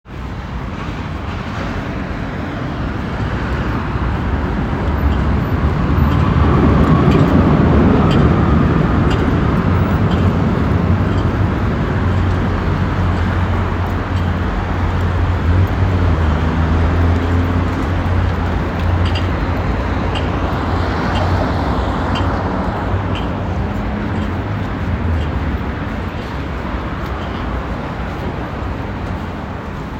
Soundscapes > Urban
Straßengeräusche am Wiener Gürtel bei der Währingerstraße - Street noise on Vienna’s Gürtel near Währingerstraße
Autos fahren am Wiener Gürtel auf Höhe Währingersrtraße an einer Ampel vorbei. Im Hintergrund hört man eine U-Bahngarnitur der Linie U6 in die Station einfahren. Für Sehbehinderte Menschen hört man das Geh- bzw. Stoppsignal der Fußgängerampel. Autos fahren über den Wiener Gürtel. Straßenlärm. Cars pass a traffic light on Vienna’s Gürtel at the level of Währingerstraße. In the background, a subway train of line U6 can be heard entering the station. For visually impaired pedestrians, the walk/stop signal of the pedestrian traffic light is audible. Cars continue to drive along the Vienna Gürtel. Street noise.
Travel, Street, Road, Passing, Public, Cars